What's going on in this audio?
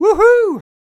Speech > Solo speech
Joyful - Wouhouh
Tascam, NPC, excited, joyful, wouhou, Man, FR-AV2, Mid-20s, Single-take, oneshot, joy, singletake, Vocal, voice, happy, U67, Voice-acting, woohoo, Male, talk, Neumann, dialogue, wouhouh, Human, Video-game